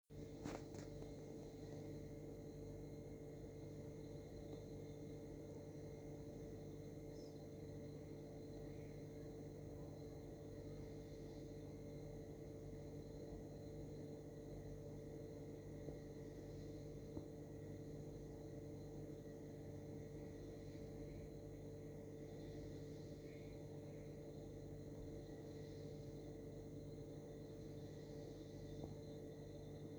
Soundscapes > Indoors
My refrigerator (Mijn Koelkast)
quiet Sound of my refrigerator.
home, Kitchen, refrigerator